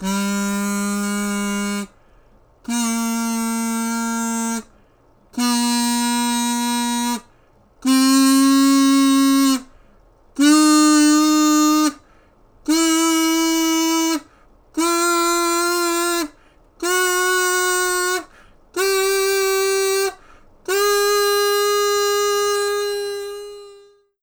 Music > Solo instrument
MUSCInst-Blue Snowball Microphone, CU Kazoo, Scale Nicholas Judy TDC
A kazoo scale.
Blue-brand, Blue-Snowball, scale